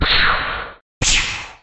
Sound effects > Other
Plasma Gun
A sound I made using Audacity!
sci-fi, space, weapon